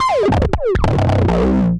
Instrument samples > Synths / Electronic
CVLT BASS 169
subbass, drops, lowend, lfo, synthbass, bass, subs, wobble, stabs, wavetable, bassdrop, sub, clear, low, subwoofer, synth